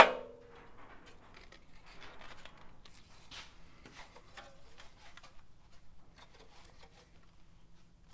Sound effects > Other mechanisms, engines, machines
Handsaw Oneshot Metal Foley 13
foley, fx, handsaw, hit, household, metal, metallic, perc, percussion, plank, saw, sfx, shop, smack, tool, twang, twangy, vibe, vibration